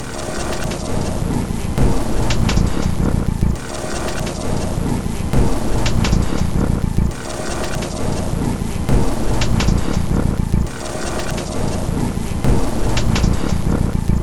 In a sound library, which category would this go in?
Instrument samples > Percussion